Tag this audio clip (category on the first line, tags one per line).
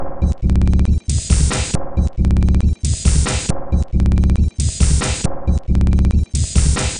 Instrument samples > Percussion
Loopable
Weird
Underground
Drum
Samples
Soundtrack
Alien
Industrial
Dark
Packs